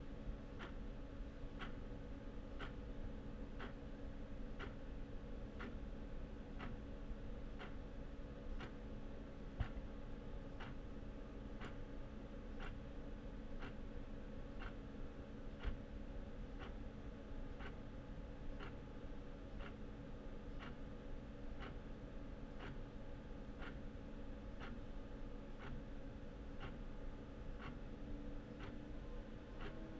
Sound effects > Objects / House appliances
just a clock ticking at home
home, clock, jvd